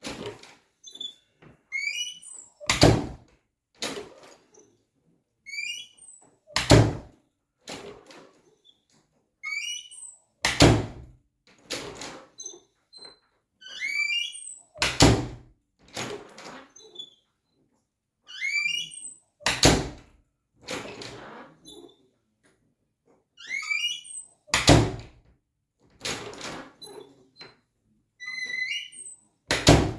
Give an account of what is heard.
Sound effects > Objects / House appliances
DOORWood-Samsung Galaxy Smartphone, CU Master Bathroom, Door Open, Close Nicholas Judy TDC
A master bathroom door opening and closing.